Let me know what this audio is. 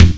Instrument samples > Percussion
snarekick trig 1
It's a mainsnare. • snare: 7×14" Tama John Tempesta Signature series • kick: 18×22" Tama Star Classic Quilted Sapele Bubinga A simultaneous snarekick (snare and kick) with multiplied attack, distorted and attenuated high-frequency decay. snarekick kicksnare snare-kick kick-snare tick tap click clack trigger trig percussion beat
snare-kick, beat, impact, mainsnare, kicksnare, strike-booster, kick-snare, tick, trig, snarekick, click, trigger, percussion, tap, clack